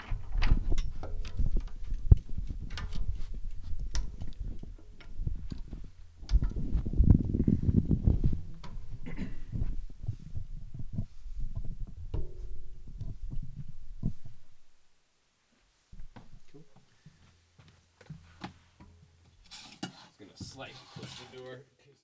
Music > Solo percussion
Floor Tom Perc Foley Rustling Hardware - 16 by 16 inch
toms, rimshot, instrument, acoustic, beatloop, roll, flam, velocity, tomdrum, studio, oneshot, rim, floortom, drums, drumkit, tom, percussion, drum, percs, kit, beat, beats, fill, perc